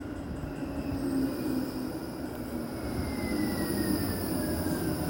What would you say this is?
Sound effects > Vehicles
Sound of a tram moving. Tram engine humming. This sample was recorded in Tampere, Finland during early winter (wet roads). Device used for recording was iPhone SE 2020. Sample might contain wind or other distant background noise. This recording was done for an audio processing assignment.
Tampere, tram, vehicle